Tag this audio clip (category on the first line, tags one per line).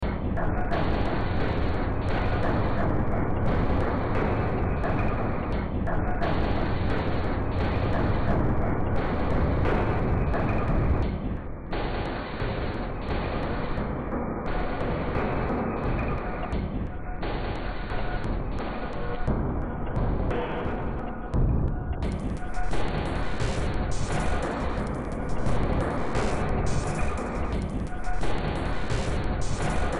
Music > Multiple instruments
Horror
Soundtrack